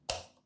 Sound effects > Objects / House appliances
Switch off 2
press, switch, click, button